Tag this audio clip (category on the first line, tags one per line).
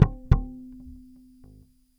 Instrument samples > String
charvel
mellow
rock
funk
loop
fx
riffs
blues
pluck
oneshots
plucked
loops
slide
electric
bass